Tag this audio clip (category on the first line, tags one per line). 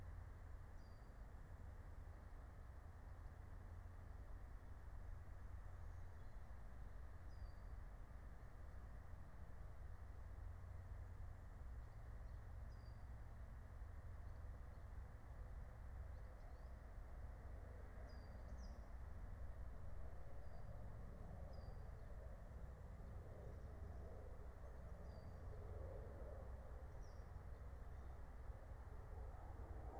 Soundscapes > Nature
raspberry-pi
nature
phenological-recording
soundscape
natural-soundscape
alice-holt-forest
meadow
field-recording